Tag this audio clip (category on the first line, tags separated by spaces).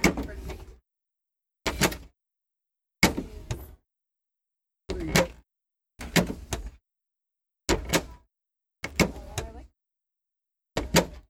Sound effects > Objects / House appliances
close dollhouse door foley open Phone-recording plastic